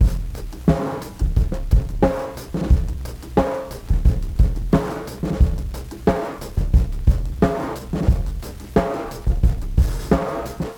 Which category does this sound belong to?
Music > Solo percussion